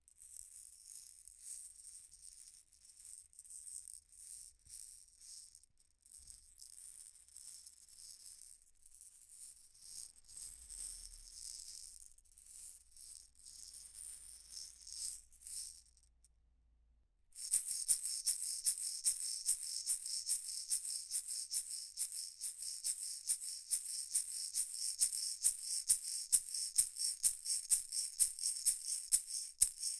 Music > Solo percussion
Subject : A 1€ egg shaker from Thomann model 133546. Being shaken juggled and all. Date YMD : 2025 July 01. Location : Albi 81000 Tarn Occitanie France. Hardware : Tascam FR-AV2 Rode NT5 Weather : Sunny, no cloud/wind 38°c 40%humidity. Processing : Trimmed in Audacity.